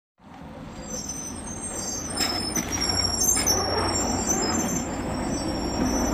Sound effects > Vehicles
final bus 20
bus, finland, hervanta